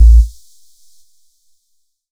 Instrument samples > Percussion
A totally fake and cheap crash I created on WaveLab 11. I used two signal files created on WaveLab 11. 1. 200 ms of a 57 ㎐ sinewave (it linearly fades out at the end of this subsample) 2. frequency band from brown noise between 3.3 k㎐ and 15.1 k㎐ [subrange selected from brown noise] (stepped fade out = staircaselike) 1. and 2. mixed/blended parallelly.
cymbals, robotic, pseudogong, noise, K-pop, crashturd, bullshit, subrange, useless, cheat, robot, crashtard, pseudocrash, crappy, trick, metal, death-metal, crash, low-quality, metardlic, electronic, electro, house, megaovergreatjunk, cymbal, pop, junk, cheap, shit, fake